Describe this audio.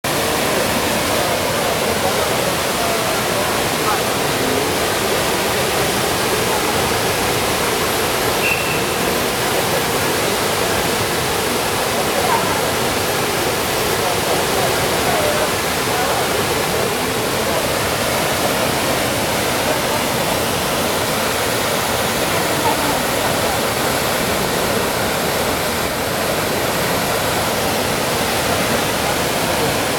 Sound effects > Human sounds and actions
Trevi Fountain Rome2022
Recording made in front of Rome's Trevi Fountain in mid-morning with few people around.
fountain, Rome, Trevi